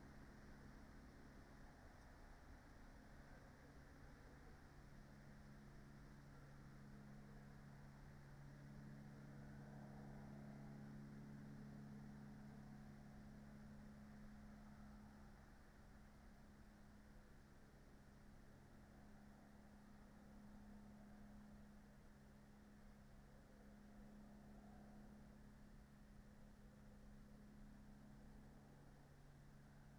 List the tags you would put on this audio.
Soundscapes > Nature
alice-holt-forest artistic-intervention data-to-sound

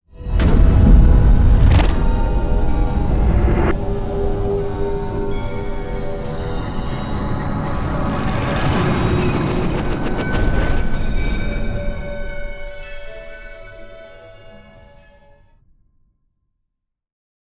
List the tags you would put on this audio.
Sound effects > Other
bass boom cinematic deep effect epic explosion game hit impact implosion indent industrial metal movement reveal riser stinger sub sweep tension trailer transition video whoosh

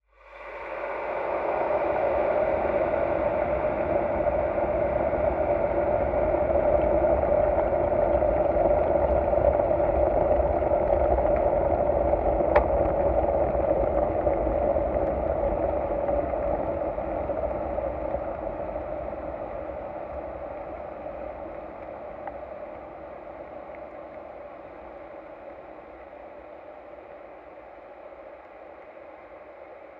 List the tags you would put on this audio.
Sound effects > Objects / House appliances
appliances deep home household indoor low

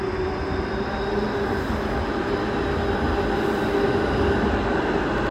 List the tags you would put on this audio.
Soundscapes > Urban
transportation tram vehicle

